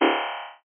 Sound effects > Electronic / Design
MOVING BRIGHT EXPERIMENTAL ERROR
BEEP, BOOP, CHIPPY, COMPUTER, DING, ELECTRONIC, HARSH, HIT, INNOVATIVE, OBSCURE, SYNTHETIC, UNIQUE